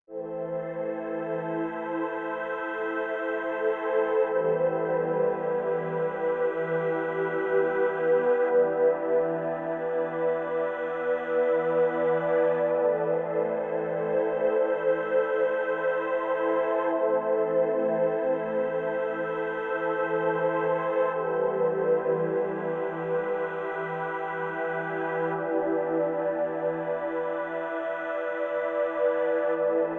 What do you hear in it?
Music > Multiple instruments
chill background music #4
Chill background music for a variety of purposes. Failing to comply will result in your project, any type, being taken down.